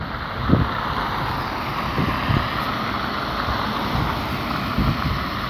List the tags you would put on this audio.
Soundscapes > Urban
car
vehicle
engine

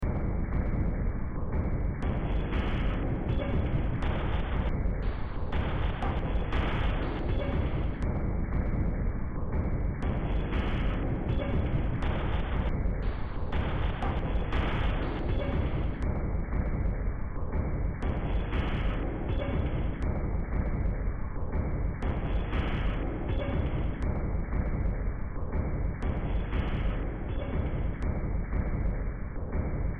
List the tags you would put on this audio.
Music > Multiple instruments
Cyberpunk
Games
Soundtrack
Underground
Sci-fi
Industrial
Noise
Horror
Ambient

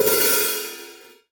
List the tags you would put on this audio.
Music > Solo instrument
Cymbal
Perc
Oneshot
Custom
Cymbals
Percussion
Hat
Vintage
Drum
Metal
Kit
Hats
Drums
HiHat

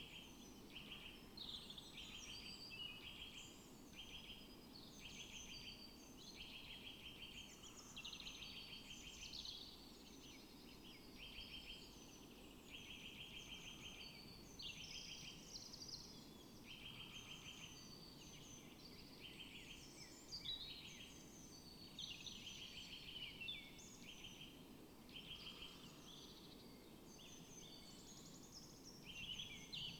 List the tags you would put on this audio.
Soundscapes > Nature
modified-soundscape
soundscape
artistic-intervention
phenological-recording
nature
data-to-sound
Dendrophone
raspberry-pi
sound-installation
weather-data
natural-soundscape
alice-holt-forest
field-recording

